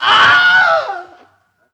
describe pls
Sound effects > Human sounds and actions
The Wilhelm Scream. See also: